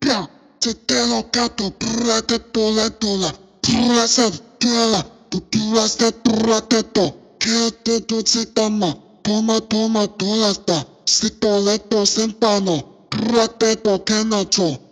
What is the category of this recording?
Music > Other